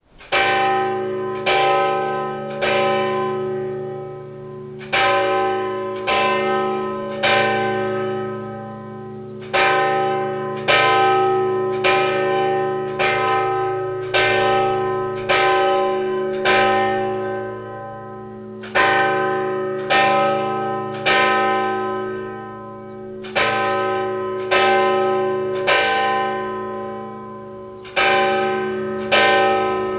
Sound effects > Other
Greek Orthodox Church bell 7am call to morning service
Recorded 20 meters away from Our Lady Evangelistria of Tinos belfry. The hammer action can be heard before each strike. Recorded using the Zoom H2essential recorder.
mass, religious, Greek, religion, call, church, Orthodox, bells, prayer